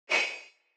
Sound effects > Other
Sword Swing 2
Sword swing effect created by combining a knife recording and a swing sound I designed with a synth.